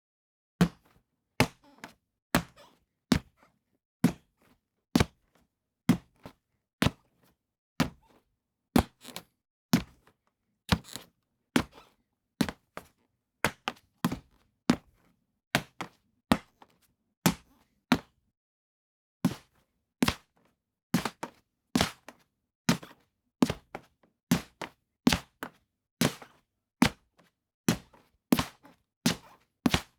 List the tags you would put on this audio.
Sound effects > Objects / House appliances
Foley
Sleppes
SoundEffects
Footsteps
Wood

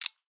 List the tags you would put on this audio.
Sound effects > Human sounds and actions
button
off
switch

